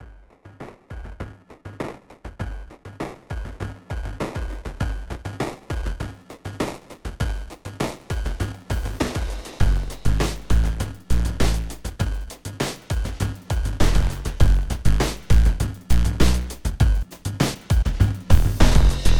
Music > Multiple instruments
Slowly Crescendoing/Evolving Beat (8 Bars, 100 bpm)
100-bpm-8-bars, 100-bpm-beat, 100-bpm-drum-beat, 100-bpm-drum-loop, 100-bpm-evolving-beat, 100-bpm-loop, ambient-evolving-beat, crescendo, crescendoing-beat, evolving-beat, podcast, podcast-intro, slowly-evolving-beat